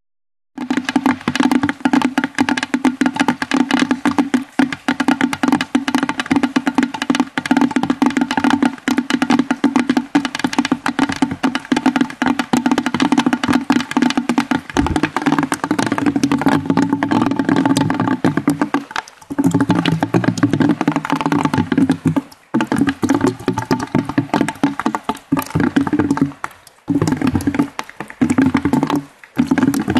Sound effects > Natural elements and explosions
rain drop
recorded at mobil phone
drop, phone